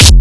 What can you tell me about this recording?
Instrument samples > Percussion
BrazilFunk Kick 16 Processed-7.6
A easy kick made with 707 kick from flstudio original sample pack, used Waveshaper maxium output to make a crispy punch. Then I layered Grv kick 13 from flstudio original sample pack too. Easily add some OTT and Waveshaper to make it fat. Processed with ZL EQ.